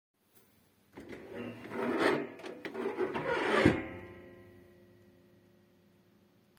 Sound effects > Objects / House appliances
Quickly opening and closing the bunk of my squeaky dorm bed.
closing, opening, open, bunk, close, eeire, squeaky, box, door, gate, squeak, chest, bed, creepy, creak